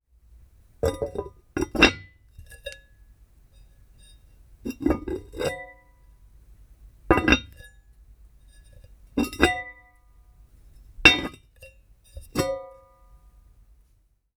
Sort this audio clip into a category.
Sound effects > Objects / House appliances